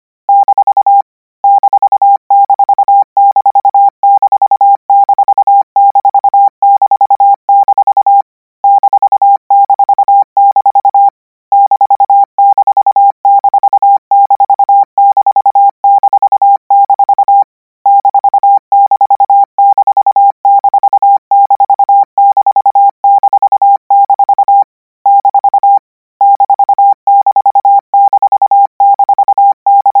Sound effects > Electronic / Design
Practice hear symbol '-' use Koch method (practice each letter, symbol, letter separate than combine), 200 word random length, 25 word/minute, 800 Hz, 90% volume.